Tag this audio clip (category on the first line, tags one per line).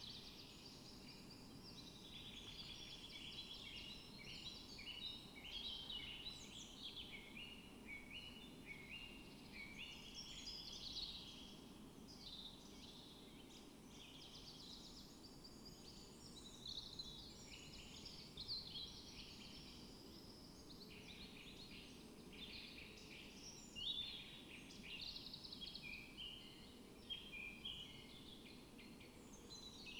Soundscapes > Nature
Dendrophone
field-recording
phenological-recording
weather-data